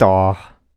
Speech > Solo speech
Affectionate Reactions - Daaah
reaction, Single-take, voice, FR-AV2, NPC, Neumann, oneshot, Mid-20s, Tascam, singletake, Male, talk, Man, Voice-acting, dah, sound, Video-game, U67, Human, affectionate, dialogue, Vocal